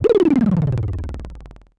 Sound effects > Experimental
Analog Bass, Sweeps, and FX-178
alien, bass, retro, synth, electro, pad, analogue, sample, machine, trippy, oneshot, vintage, robot, sci-fi, electronic, weird, sfx, sweep, scifi, korg, fx, analog